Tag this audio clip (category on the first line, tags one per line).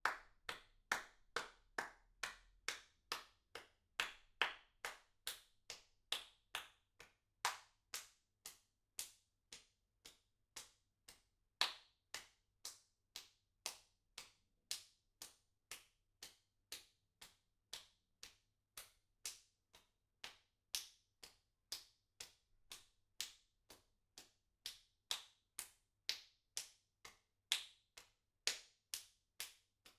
Sound effects > Human sounds and actions
Applaud
Applauding
Applause
AV2
clap
clapping
FR-AV2
individual
indoor
NT5
person
Rode
solo
Solo-crowd
Tascam
XY